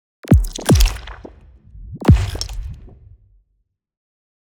Sound effects > Electronic / Design
Kick & Foley Impact Layer
A random study of deeper impacts; could go underneath some melodic hits or some bass hits. Made with Native Instruments Massive and foley of a drill.